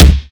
Instrument samples > Percussion
This tom is part of the Tama Star Classic Bubinga Tomset (every tom is in my tom folder). I uploaded the attacked and unattacked (without attacks) files. • tom 1 (hightom): 9×10" Tama Star Classic Bubinga Quilted Sapele • tom 2 (midtom): 10×12" Tama Star Classic Bubinga Quilted Sapele • tom 3 (lowtom): 14×14" Tama Star Classic Bubinga Quilted Sapele • floor 1 (lightfloor): 16×16" Tama Star Classic Bubinga Quilted Sapele → floor 2 (deepfloor): 14×20" Tama Star Classic Bubinga Gong Bass Drum tags: tom tom-tom Tama-Star Tama bubinga sapele 16x16-inch 16x16-inches bubinga death death-metal drum drumset DW floor floortom floortom-1 heavy heavy-metal metal pop rock sound-engineering thrash thrash-metal unsnared Pearl Ludwig Majestic timpano